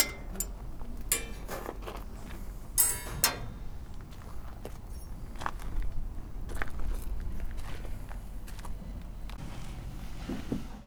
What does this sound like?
Sound effects > Objects / House appliances
Junkyard Foley and FX Percs (Metal, Clanks, Scrapes, Bangs, Scrap, and Machines) 171
Junk, Atmosphere, Robotic, SFX, Bang, tube, Ambience, Smash, Bash, rattle, Foley, Junkyard, Dump, trash, Clank, Robot, dumpster, Environment, scrape, dumping, FX, Metallic, Machine, waste, Percussion, rubbish, Perc, garbage, Clang, Metal